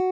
Instrument samples > String
A random guitar tone that is shortened. Good for experiments. Good for sound design. The pack contains tones that create an arpeggio one after the other.
tone, design, guitar, sound, stratocaster, arpeggio, cheap